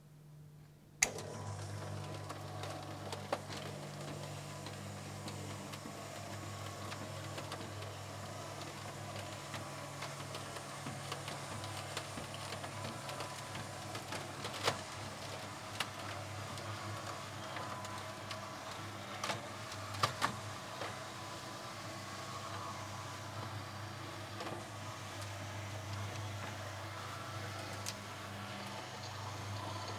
Sound effects > Objects / House appliances
Macro This is a recording of an door shutter closing completely. The sound captures the entire event from start to finish. Meso The event is broken down into distinct actions: the slow descent of the shutter, the final closing motion, and the closing of the horizontal slats. The sound is a combination of the continuous sound of the shutter lowering, followed by the percussive 'clack' of the slats closing together. Micro The recording has a distinct two-part sonic envelope. The initial sound is a continuous, mechanical rumble or whirring noise with a low frequency, indicating the downward movement of the shutter. This is followed by a series of distinct, sharp clicks and clacking sounds as the slats interlock and move upward to close the gaps, creating a staccato effect. Technical Recorded with a Rode Wireless GO II RX, using two TX and an Android phone.
everyday, house